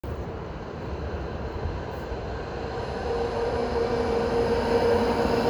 Soundscapes > Urban
A tram passing the recorder in a roundabout. The sound of the tram accelerating can be heard. Recorded on a Samsung Galaxy A54 5G. The recording was made during a windy and rainy afternoon in Tampere.
city, accelerating, tram